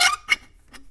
Sound effects > Other mechanisms, engines, machines
metal shop foley -107
bam, boom, crackle, fx, knock, little, perc, percussion, rustle, sfx, shop, strike, tink, tools, wood